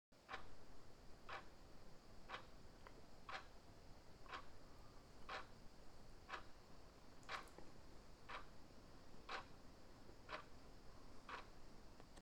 Sound effects > Other mechanisms, engines, machines
ticking of the clock 2
ticking of the clock
clock, ticking, time